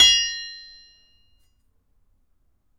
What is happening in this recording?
Sound effects > Other mechanisms, engines, machines
metal shop foley -041
bang, knock, fx, oneshot, crackle, bop, perc, metal, percussion, rustle, tink, foley, wood, shop, sound, strike, thud, pop, boom, sfx, bam, little, tools